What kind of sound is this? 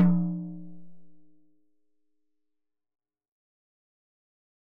Music > Solo percussion
Hi Tom- Oneshots - 30- 10 inch by 8 inch Sonor Force 3007 Maple Rack
flam, drumkit, percussion, hi-tom, drum, tomdrum, roll, acoustic, tom, oneshot, hitom, drums, rimshot, beats, fill, studio, perc, instrument, toms, beat, rim, beatloop, velocity, kit, percs